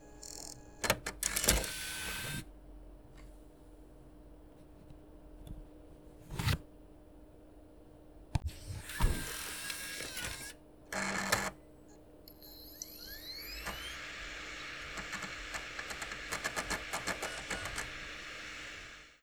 Sound effects > Other mechanisms, engines, machines
Wii U Ejecting/Inserting Disc
This is a recording of me ejecting and inserting a disc into my old Wii U. Listening to it run gives me a lot a good memories, and I think it could sound cool for a robot or something. :D Also the disc I used was Mario Party 10. I know, everyone's "Favorite" :P